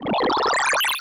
Sound effects > Other
6 - Applying a buff Synthesized in ChipTone, then edited in ProTools